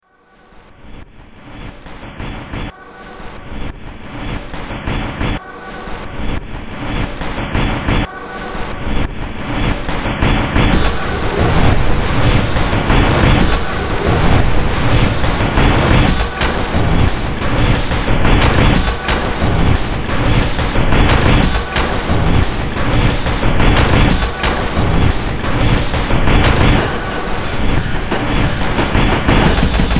Music > Multiple instruments
Demo Track #3945 (Industraumatic)
Horror, Soundtrack